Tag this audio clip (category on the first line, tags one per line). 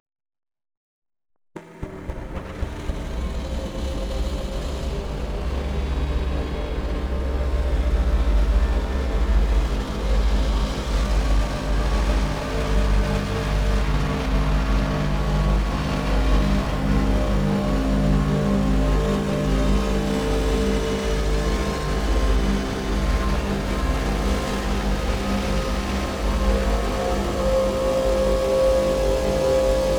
Soundscapes > Synthetic / Artificial
alien
ambience
ambient
atmosphere
bass
bassy
dark
drone
effect
evolving
experimental
fx
glitch
glitchy
howl
landscape
long
low
roar
rumble
sfx
shifting
shimmer
shimmering
slow
synthetic
texture
wind